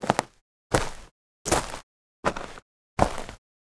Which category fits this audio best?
Sound effects > Human sounds and actions